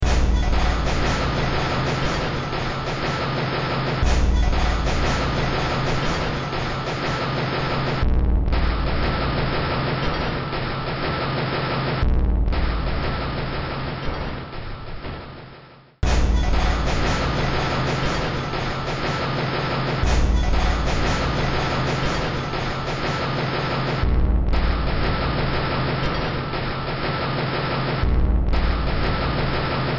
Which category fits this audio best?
Music > Multiple instruments